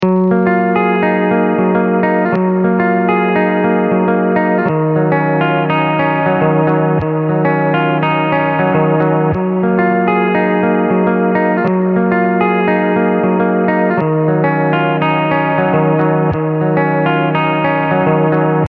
Music > Solo instrument
Loop #1 - (Key of C# Major)
I made these with my electric guitar! Even for business purposes or for your own music!